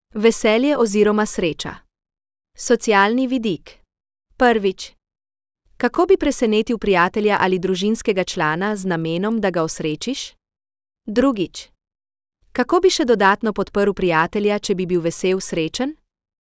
Speech > Solo speech
4. VESELJE - Socialni vidik
women, speech, questions, emotions, cards, happiness. The sounds were created using the WooTechy VoxDo app, where we converted the text with questions into an audio recording.
women, speech, happiness, cards, emotions, questions